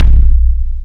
Instrument samples > Percussion
Top-tier weak bassdrum/kick.